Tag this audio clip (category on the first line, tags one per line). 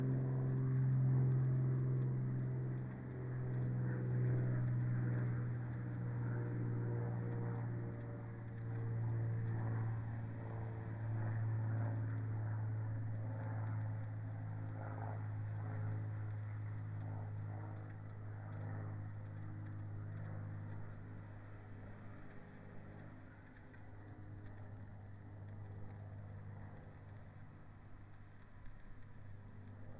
Soundscapes > Nature
soundscape
raspberry-pi
field-recording
Dendrophone
weather-data
phenological-recording
data-to-sound
sound-installation
natural-soundscape
modified-soundscape
alice-holt-forest
artistic-intervention
nature